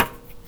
Sound effects > Other mechanisms, engines, machines
Handsaw Oneshot Metal Foley 12
foley, fx, handsaw, hit, household, metal, metallic, perc, percussion, plank, saw, sfx, shop, smack, tool, twang, twangy, vibe, vibration